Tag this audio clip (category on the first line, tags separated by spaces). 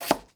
Sound effects > Other
Chop Kitchen Vegetable Quick Chef Home Cooking Cook